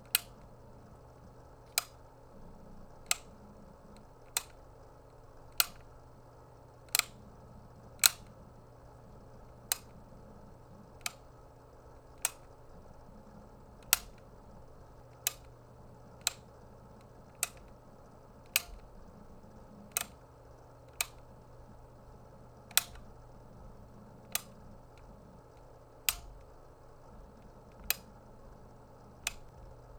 Sound effects > Objects / House appliances
MACHAppl-Blue Snowball Microphone Hair Dryer, Switch, On, Off Nicholas Judy TDC
A hair dryer switching on and off.
Blue-brand, Blue-Snowball, foley, hair-dryer, off, switch